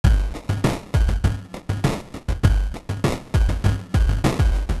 Music > Multiple instruments
Corrupted Groove (2 bars, 100 bpm)
2-bar-100-bpm-loop, edm, 100-bpm-2-bars-beat, 100-bpm, 100-bpm-beat, drum-loop, distorted-beat, glitch-loop-2-bars-100-bpm